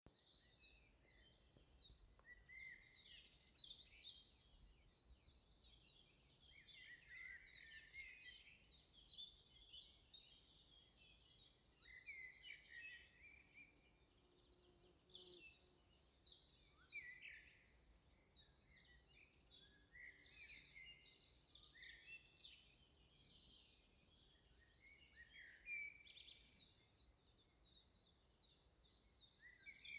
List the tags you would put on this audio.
Soundscapes > Nature
spring forest birdsong nature woods birds

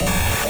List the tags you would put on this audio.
Sound effects > Electronic / Design
digital glitch hard mechanical one-shot pitched

